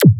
Instrument samples > Percussion
Glitch-Liquid Kick 2
Sample used from FLstudio original sample pack. Plugin used: Vocodex.
Glitch, Kick, Liquid